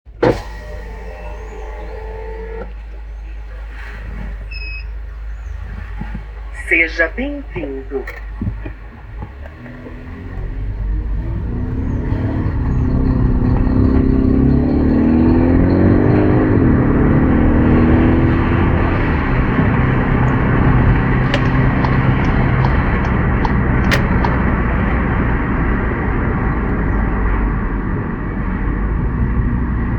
Soundscapes > Urban
Car driving, car say hello in portuguese and close windows.